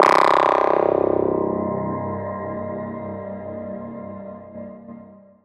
Instrument samples > Synths / Electronic
CVLT BASS 150
lowend, stabs, clear, wavetable, synth, low, synthbass, wobble, lfo, bassdrop, subwoofer, bass, drops, subs, sub, subbass